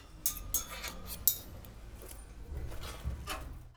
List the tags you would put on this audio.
Sound effects > Objects / House appliances
Metal
tube
FX
scrape
rubbish
Robot
Foley
dumping
Bash
Clank
Metallic
trash
SFX
Percussion
dumpster
rattle

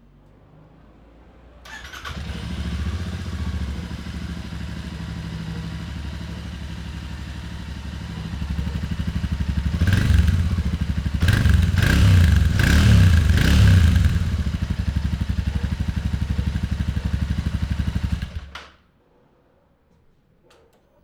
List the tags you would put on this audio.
Sound effects > Vehicles
enfield
Moto
royal
motocicleta
650CC

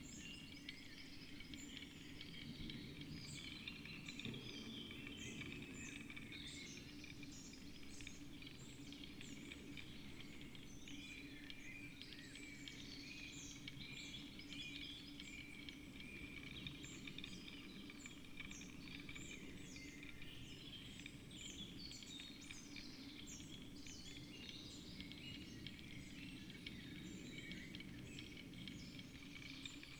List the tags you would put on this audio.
Soundscapes > Nature
Dendrophone
soundscape
artistic-intervention
field-recording
modified-soundscape
sound-installation
phenological-recording
weather-data
data-to-sound
nature
raspberry-pi
natural-soundscape
alice-holt-forest

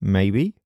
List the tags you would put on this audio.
Speech > Solo speech
Shotgun-mic
Calm
hesitant
2025
Generic-lines
MKE600
Shotgun-microphone
Single-mic-mono
Adult

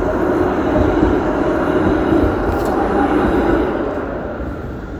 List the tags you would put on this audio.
Sound effects > Vehicles
tramway
transportation
vehicle

